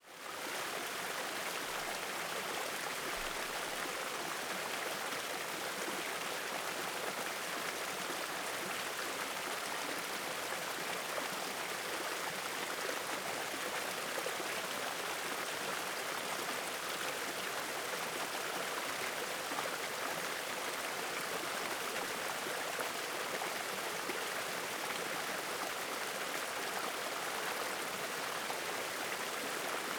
Soundscapes > Nature
Small waterfall in Therma, Ikaria, Greece.